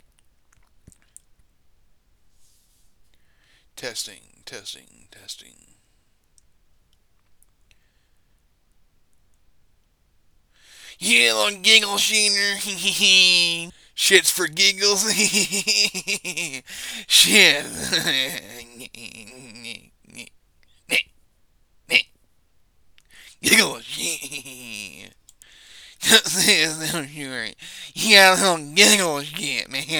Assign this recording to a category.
Sound effects > Human sounds and actions